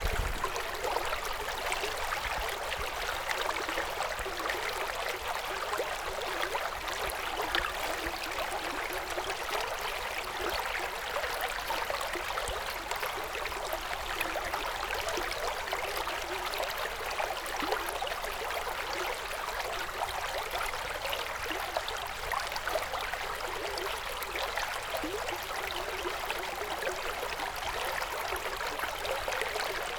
Soundscapes > Nature
FX River 03
Recorded at Jacobsburg State Park in PA.
babbling, brook, creek